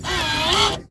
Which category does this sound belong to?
Sound effects > Other mechanisms, engines, machines